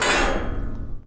Sound effects > Objects / House appliances
Junkyard Foley and FX Percs (Metal, Clanks, Scrapes, Bangs, Scrap, and Machines) 16
rattle, Junk, garbage, trash, Clang, Bang, Ambience, waste, Dump, Atmosphere, Foley, Robot, scrape, Metal, Metallic, SFX, rubbish, Environment, Smash, dumping, Machine, Robotic, Junkyard, dumpster, Percussion, Bash, tube, Perc, FX, Clank